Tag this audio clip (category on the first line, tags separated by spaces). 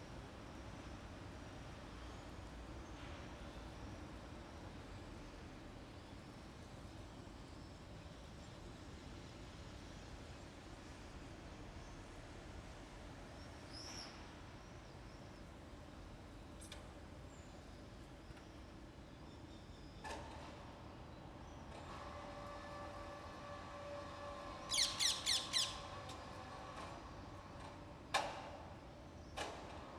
Soundscapes > Urban
istituto-svizzero Italie morning ambiance swift crane italia Roma construction birds rooftop field-recording crows parrot parakeet seagull cityscape